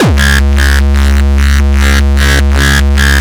Instrument samples > Percussion
Funny Kick 1 #C
A stupid kick synthed with phaseplant only.